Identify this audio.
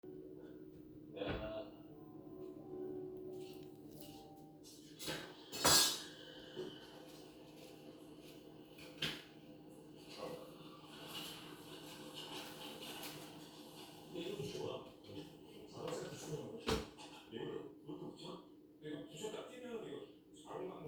Sound effects > Other
TV sound coming from the living room and knife chopping sounds from the kitchen